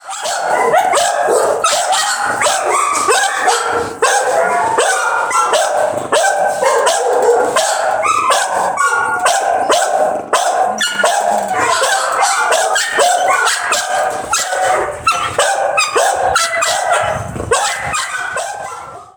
Sound effects > Animals
Dogs - Various Dogs Barking in Shelter, Various Perspectives
Those are different dogs at my local animal shelter barking, whose barking was recorded with an LG Stylus 2022 and those may include pit bulls, bulldogs, and mutts.